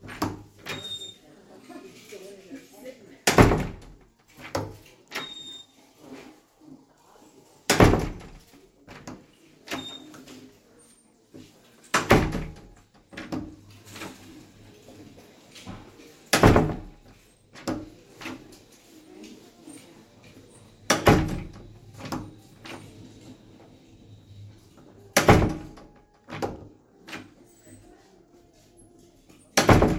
Sound effects > Objects / House appliances
DOORWood-Samsung Galaxy Smartphone, CU Fitting Room, Open, Close Nicholas Judy TDC
A fitting room door opening and closing. Recorded at Goodwill.
close, open, foley, wood, door, fitting-room, Phone-recording